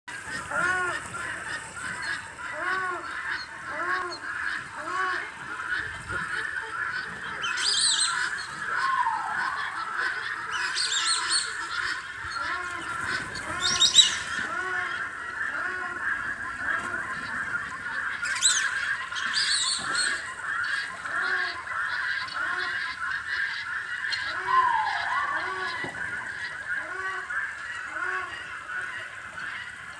Sound effects > Animals
Aviary - Various; includes Large Guineafowl Group and White-faced Whistling Ducks
Recorded with an LG Stylus 2022. At Hope Ranch Zoo is an aviary home to among other birds, various ducks, including these white-faced whistling ducks.